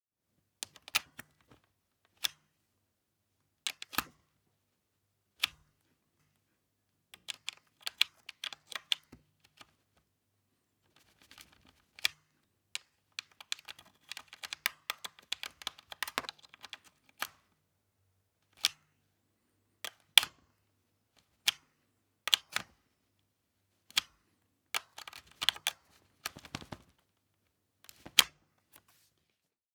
Sound effects > Objects / House appliances
CMPTMisc Cinematis RandomFoleyVol5 Peripherals Laptop USB PlugIn&Out
Crunchy, metallic USB plug-in/out sounds with crisp contact and release transients. This is one of the several freebies from my Random Foley | Vol.5 | Peripherals | Freebie pack.
plug, unplug, metallic, transient, laptop, foley, crunchy, peripheral, USB, contact